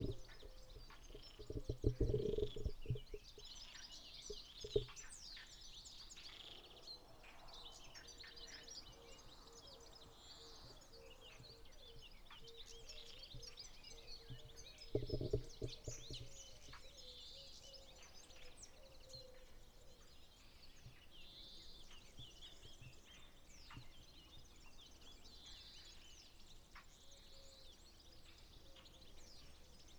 Sound effects > Animals
PORTUGAL MORNING LAKE 5
Some recordings made around sunrise closer to a lake near a forest. Frogs and birds can be heard, Unprocessed recordings made with Zoom recorder